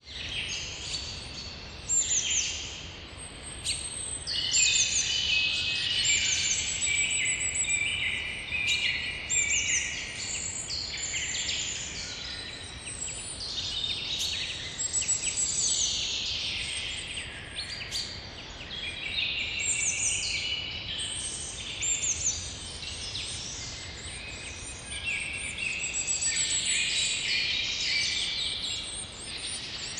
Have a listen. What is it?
Soundscapes > Nature
Forest atmosphere 005(localization Poland)
rural, Poland, ambient, soundscape, atmosphere, ambience, calm, field-recording, birds, birdsong, forest, peaceful, background, natural, nature, environmental, European-forest, outdoor, wild